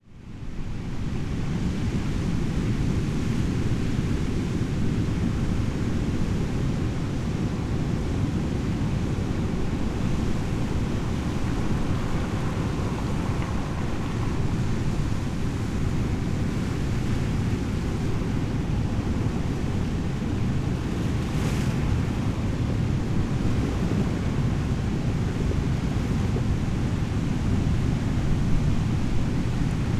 Soundscapes > Nature
waves move pebbles - montana de oro- 01.02.24
Waves roar in the distance and break against the shore, caressing pebbles of shale. Montaña de Oro, California Recorded with Zoom H6 xy or ms mic I don't remember which
field-recording, ocean, pebbles, waves